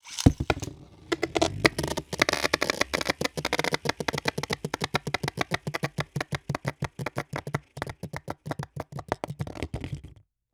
Sound effects > Objects / House appliances
Beyblade Cardboard-box
A Bayblade (Scythe Incendio 3-80B) scrubbing against a cardboard box.
Shure, cardboard, rubbing, metal, Tascam, Dare2025-Friction, Beyblade-X, box, SM57, Beyblade, Dare2025-09, FR-AV2